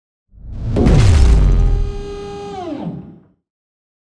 Sound effects > Other mechanisms, engines, machines
Sound Design Elements-Robot mechanism-015
actuators, automation, circuitry, clanking, clicking, design, digital, elements, feedback, gears, grinding, hydraulics, machine, mechanical, mechanism, metallic, motors, movement, operation, powerenergy, processing, robot, robotic, servos, sound, synthetic, whirring